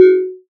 Instrument samples > Synths / Electronic
CAN 2 Gb
additive-synthesis, bass, fm-synthesis